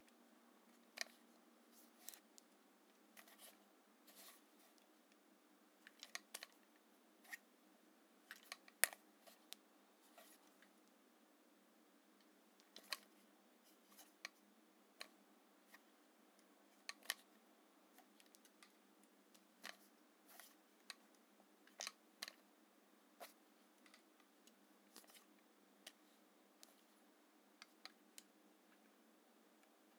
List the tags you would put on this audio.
Sound effects > Objects / House appliances
35mm Analog Antique Camera Film

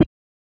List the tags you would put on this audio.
Sound effects > Objects / House appliances
masonjar rub glass squeak friction

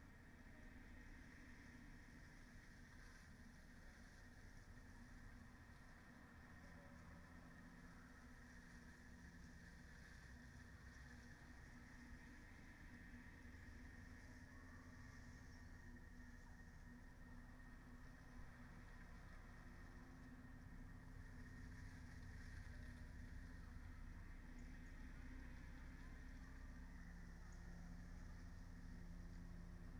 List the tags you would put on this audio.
Soundscapes > Nature
raspberry-pi Dendrophone sound-installation artistic-intervention modified-soundscape phenological-recording weather-data natural-soundscape field-recording data-to-sound nature alice-holt-forest soundscape

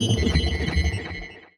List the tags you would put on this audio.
Sound effects > Electronic / Design
message sci-fi selection

